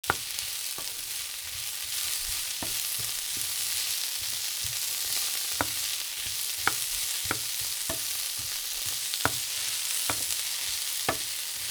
Sound effects > Objects / House appliances
Sizzling food 05
Kitchen cooking sound recorded in stereo. Stirred with wooden spoon.
Cooking, Food, Household, Kitchen